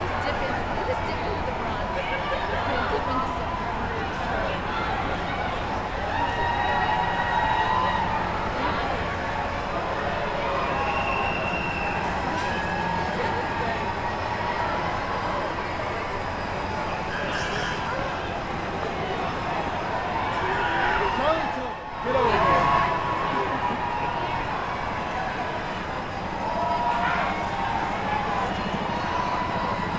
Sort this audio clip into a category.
Soundscapes > Urban